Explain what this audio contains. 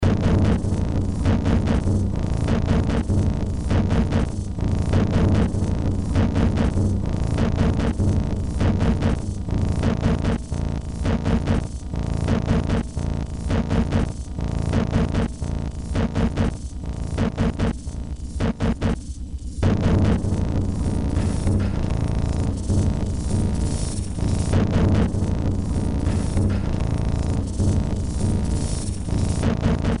Music > Multiple instruments
Demo Track #3926 (Industraumatic)
Horror, Cyberpunk, Underground, Games, Noise, Industrial, Ambient, Soundtrack, Sci-fi